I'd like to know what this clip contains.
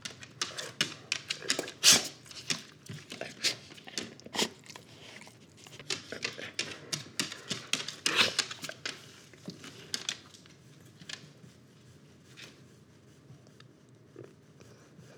Sound effects > Animals
Dog Snorts & Feet on Hardwood Floor
The sound of a dog making snorting sounds and her feet tapping on a hardwood floor. Recorded with Sound Devices Mix Pre-3 and a Wildtronics Parabolic Microphone. on 11/19/23. Filtered with GoldWave Rest in peace, Maggie. 11/28/25.